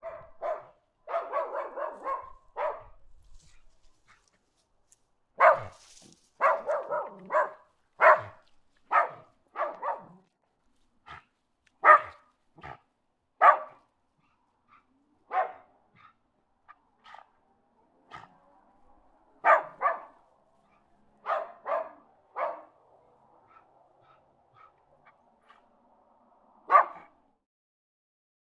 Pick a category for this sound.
Sound effects > Animals